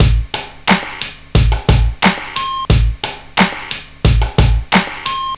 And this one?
Music > Other
hip hop 5 drums 89 bpm
FL studio 9 pattern construction
groovy, hiphop, percussion-loop, loop, rubbish, drum, beat, trip, percs, drums, quantized, rhythm